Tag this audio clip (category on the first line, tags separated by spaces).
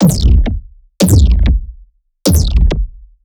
Sound effects > Other
cannon rifle semi-automatic sci-fi automatic electronic gun futuristic scifi railgun weapon